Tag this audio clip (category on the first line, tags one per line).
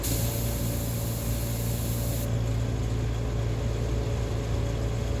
Sound effects > Other mechanisms, engines, machines
air
car
fill
loop
Phone-recording
tire
up